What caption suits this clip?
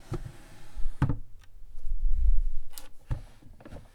Sound effects > Objects / House appliances
Wooden Drawer 12
open, wooden